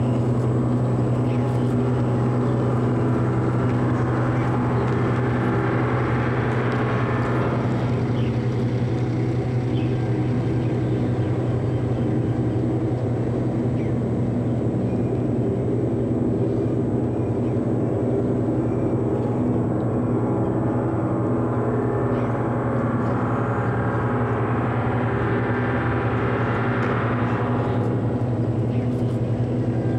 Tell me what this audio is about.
Soundscapes > Synthetic / Artificial
An experimental, looped texture designed in Reason Studios.